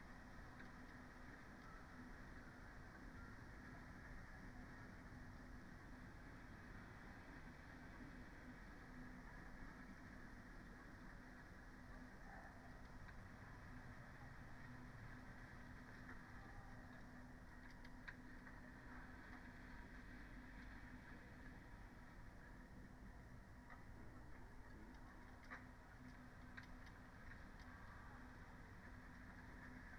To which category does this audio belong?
Soundscapes > Nature